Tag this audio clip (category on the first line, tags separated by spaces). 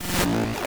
Sound effects > Electronic / Design
stutter digital pitched one-shot glitch hard